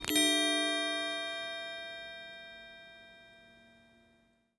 Instrument samples > Other
Baoding Balls - High - 02 (Short)
balls, baoding, closerecording